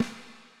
Music > Solo percussion
Snare Processed - Oneshot 17 - 14 by 6.5 inch Brass Ludwig
acoustic, beat, brass, crack, drum, drumkit, drums, flam, fx, hit, hits, kit, ludwig, oneshot, perc, percussion, processed, realdrum, realdrums, reverb, rim, rimshot, rimshots, roll, sfx, snare, snaredrum, snareroll, snares